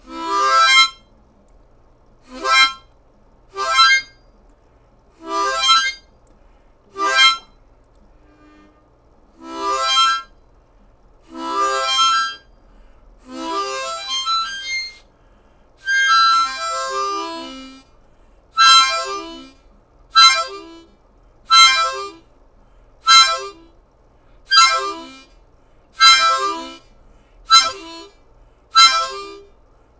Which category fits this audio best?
Music > Solo instrument